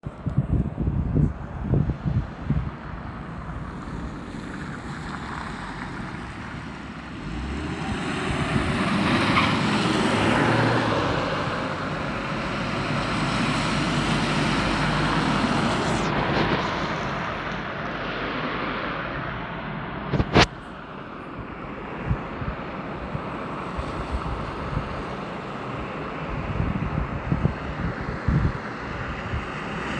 Soundscapes > Urban
Car passing by, recorded with a mobilephone Samsung Galaxy S25, recorded in windy and rainy evening in Tampere suburban area. Wet asphalt with a little gravel on top and car had wintertyres